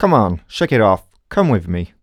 Speech > Solo speech
Comon shake it off come with me
Subject : I was looking for a few "Dj chants" / vocals to hype up songs. Frankly I'm not a very good voice actor, not a hype person in general so these get out of my personality, therefore it was challenging and a little cringe as you can hear lol. Also these are dry takes, a little FX and backing vocals would probably help with the intent of going in music. Weather : Processing : Trimmed and Normalized in Audacity, Faded in/out. Notes : I think there’s a “gate” like effect, which comes directly from the microphone. Things seem to “pop” in. Tips : Check out the pack!
oneshot, chant, hype, Man, Vocal, Single-take, Mid-20s, singletake, Neumann, Male, sentence, Tascam, U67, FR-AV2, voice